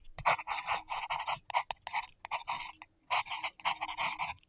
Sound effects > Objects / House appliances
Fountainpen Write 1 Texture
Writing on notebook paper with an ink fountain pen, recorded with an AKG C414 XLII microphone.